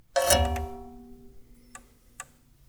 Sound effects > Other mechanisms, engines, machines
Dewalt 12 inch Chop Saw foley-027
Blade, Chopsaw, Circularsaw, Foley, FX, Metal, Metallic, Perc, Percussion, Saw, Scrape, SFX, Shop, Teeth, Tool, Tools, Tooth, Woodshop, Workshop